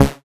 Instrument samples > Synths / Electronic
CINEMABASS 4 Db
additive-synthesis, fm-synthesis, bass